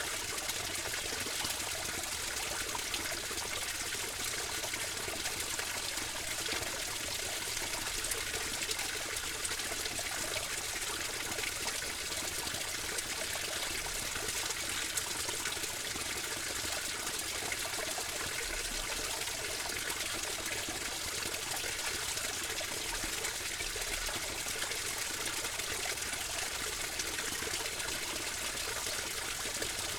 Soundscapes > Nature
Water stream fast flow
small stream flowing fast in a forest